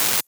Instrument samples > Synths / Electronic
databent open hihat 6
A databent open hihat sound, altered using Notepad++
databending, glitch, hihat, percussion